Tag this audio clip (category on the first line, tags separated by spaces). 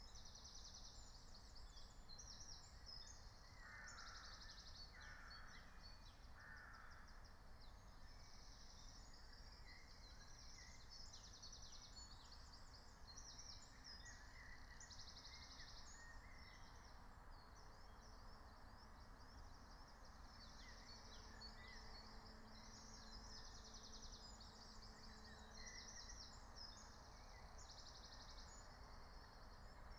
Soundscapes > Nature
soundscape nature meadow alice-holt-forest phenological-recording natural-soundscape raspberry-pi field-recording